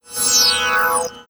Sound effects > Electronic / Design
Magic Dispell
Undo a magic spell today! Get the Dnaw Cigam for a free 7-day trial! In Audacity, I removed some noise, reversed and sped up (8x I believe) one of the samples.
magic, sped-up, despell, transformation, dnd, audio-manipulation, reverse, otherworldly, fantasy, backwards, experimental, eerie, witchcraft, haunting, spell, arcane, spellcasting, wizard, alteration, ethereal, enchantment, ritual, mystical, magician, dispell, surreal, magical, supernatural, time-warp